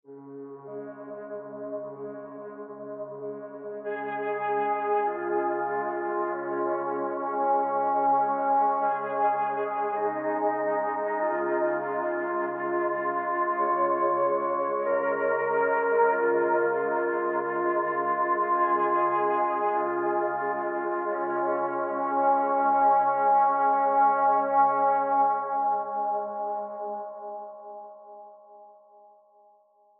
Music > Solo instrument
The sounds of a mysterious flute float through the sunlit trees of an ancient forest. Made with my MIDI controller, GarageBand, and BandLab.